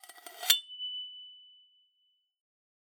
Sound effects > Objects / House appliances
A recording of a knife being scraped along a sharpener.